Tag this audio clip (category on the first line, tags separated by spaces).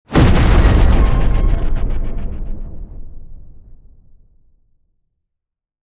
Sound effects > Other
hit percussive cinematic impact power collision shockwave crash strike sound game effects thudbang hard rumble heavy sharp force explosion audio sfx blunt transient smash design